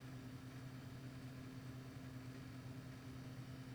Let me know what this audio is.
Sound effects > Other mechanisms, engines, machines
This was a machine hum from my school's cafeteria
Machine, Quiet